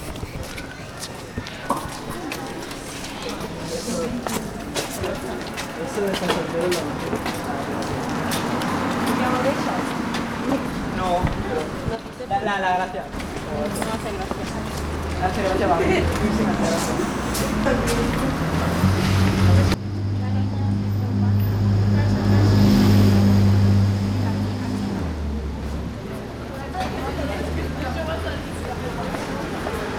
Soundscapes > Urban
20251114 CarrerSantuari Cars Motorbike Steps Voices Noisy
Urban Ambience Recording in collab with Coves del Cimany High School, Barcelona, November 2026. Using a Zoom H-1 Recorder.